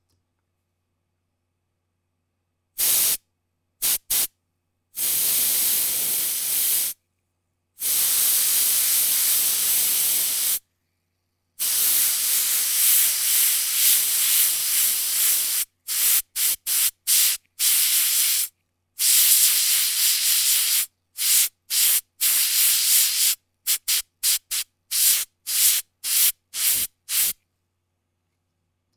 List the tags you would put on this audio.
Sound effects > Objects / House appliances
Can Paint Spray

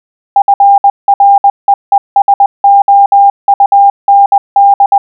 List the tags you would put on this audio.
Sound effects > Electronic / Design
code codigo letters morse radio

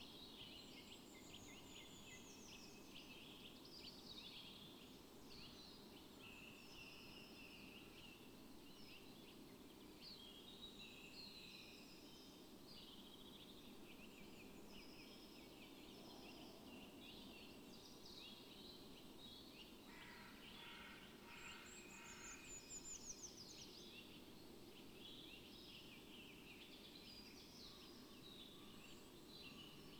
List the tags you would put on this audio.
Soundscapes > Nature
raspberry-pi phenological-recording Dendrophone soundscape alice-holt-forest modified-soundscape artistic-intervention natural-soundscape weather-data data-to-sound nature sound-installation field-recording

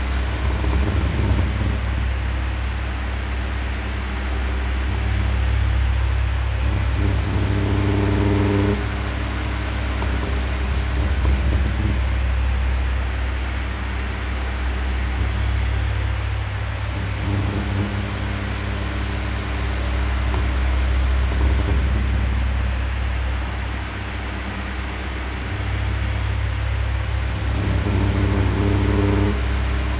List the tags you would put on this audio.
Sound effects > Other mechanisms, engines, machines
noise buzz Sennheiser air hum Tascam Shotgun-microphone MKE600 Shotgun-mic distant Single-mic-mono FR-AV2 MKE-600 Fan humm Hypercardioid above

